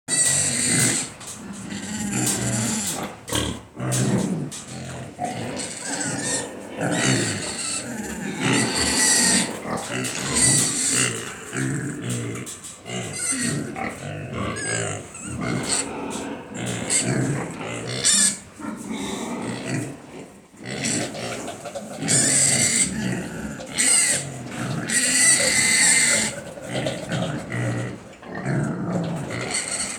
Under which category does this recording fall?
Sound effects > Animals